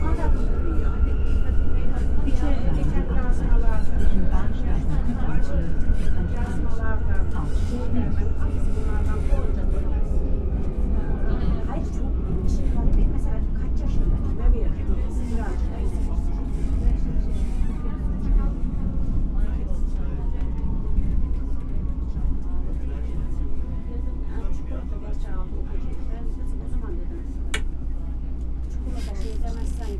Soundscapes > Urban
CRWDWalla Ubahn Subway Wien Conversation Train Vienna
subway ride in vienna, wagon full of people recorded with 2 x Clippy EM272 + Zoom F3